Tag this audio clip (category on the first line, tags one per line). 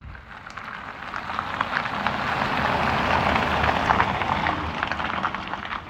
Sound effects > Vehicles
driving
electric
vehicle